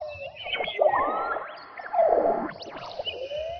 Soundscapes > Synthetic / Artificial
LFO Birdsong 56
Birdsong, LFO, massive